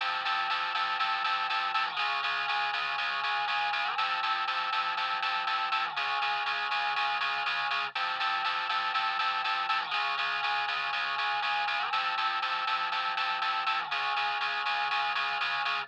Music > Solo instrument
Otherwise, it is well usable up to 4/4 120.8 bpm.